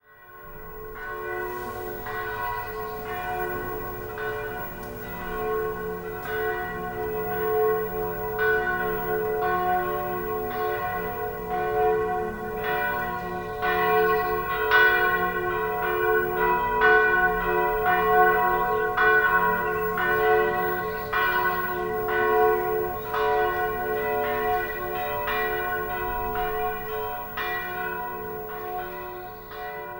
Soundscapes > Urban
Sounds of churchbells from a church in Haar - Haar 1 (St. Raphael) - Gemany - recorded with a Tascam DR-05 field recorder on 27.05.2017. Just leveled it up a bit to -5 db. All the best - Happy holidays and a happy new year...
Churchbells, Sankt, Raphael, Church, Haar, Bells